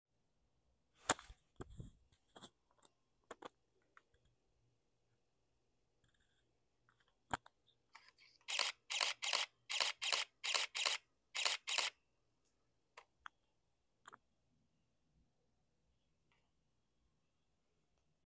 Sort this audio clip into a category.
Sound effects > Electronic / Design